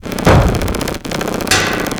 Music > Multiple instruments
Industrial Estate 37
120bpm, techno, chaos, soundtrack, Ableton, loops, industrial